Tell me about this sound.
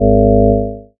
Instrument samples > Synths / Electronic
WHYBASS 1 Ab
additive-synthesis, bass, fm-synthesis